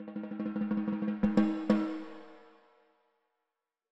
Music > Solo percussion
snare Processed - med-hard fill ending - 14 by 6.5 inch Brass Ludwig
acoustic, brass, crack, drum, drumkit, drums, hits, kit, ludwig, oneshot, perc, percussion, rim, rimshots, roll, sfx, snaredrum, snareroll